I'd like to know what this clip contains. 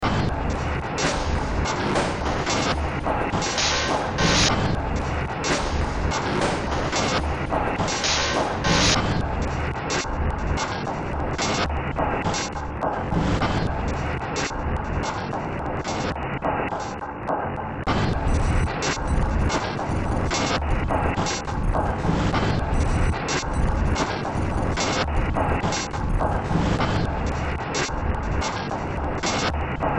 Music > Multiple instruments
Demo Track #3134 (Industraumatic)
Ambient, Cyberpunk, Sci-fi, Underground